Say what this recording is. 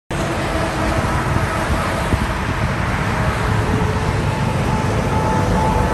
Sound effects > Vehicles
Sun Dec 21 2025 (13)
Car passing by in highway
highway, car, road